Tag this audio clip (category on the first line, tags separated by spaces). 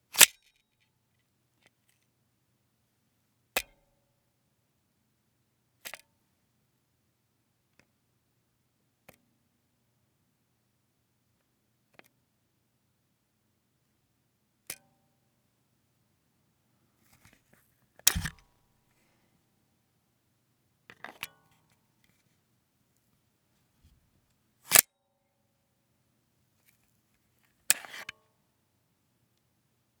Sound effects > Other mechanisms, engines, machines
Gun Pistol Firearm Weapon